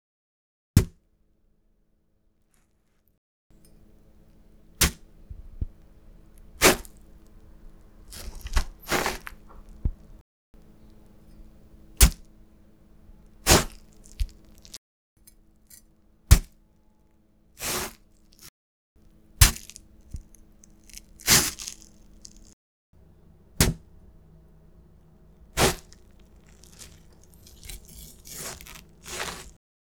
Sound effects > Objects / House appliances
sounds of watermelon meat being cut or stabbed with a knife. can work for as a bass or used for punching or kicking characters.
cut, stab, cutting, watermelon, kitchen, knife, food, slicing, slice